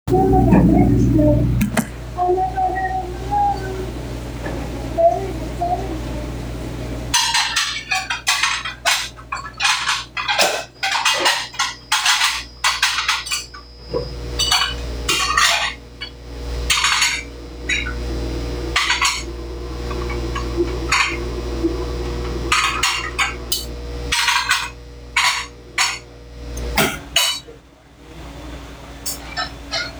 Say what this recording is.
Soundscapes > Indoors
Sounds of a dish-pit in the kitchen of a fine dining establishment somewhere in Tulsa, OK.
kitchen, voices